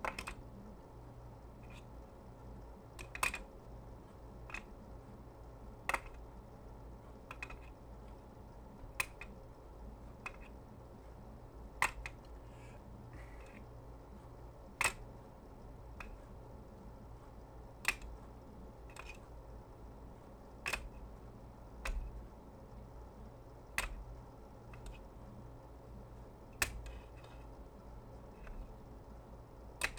Sound effects > Objects / House appliances
OBJFash-Blue Snowball Microphone, MCU Sunglasses, Closed Frame, Pick Up, Set Down, Drop Nicholas Judy TDC
Closed framed sunglasses pick up, set down and drop.